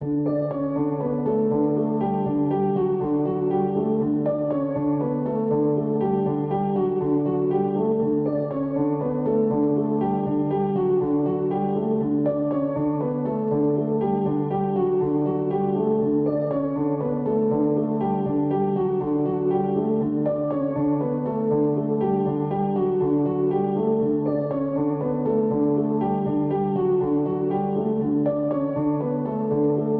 Music > Solo instrument
Piano loops 058 efect 4 octave long loop 120 bpm
Otherwise, it is well usable up to 4/4 120 bpm.